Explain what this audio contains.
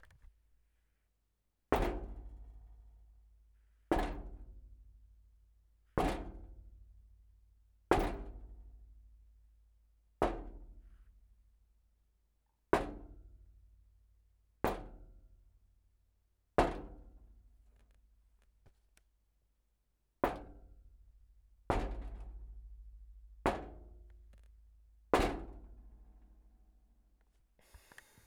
Sound effects > Other
Soft Sheet Metal Impacts
Tapping an old radiator heater made of thin pieces of sheet metal with my fist.
interaction, impact, medium-soft, Metal